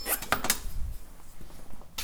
Sound effects > Other mechanisms, engines, machines
metal shop foley -084
tools, tink, pop, oneshot, crackle, knock, percussion, metal, sfx, little, rustle, thud, wood, strike, foley, boom, bam, bang, bop, sound, fx, shop, perc